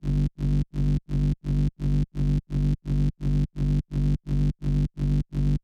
Music > Other
This is a bass made with a serum in G2
Bass, G2, Tribe